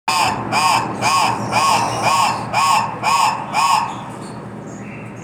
Sound effects > Animals
Miscellaneous Birds - Red-crested Turaco
Recorded with an LG Stylus 2022 at Hope Ranch. Turacos are birds native to Africa.